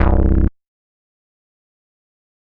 Instrument samples > Synths / Electronic
syntbas0008 C-kr
VSTi Elektrostudio (Model Mini+Micromoon+Model Pro)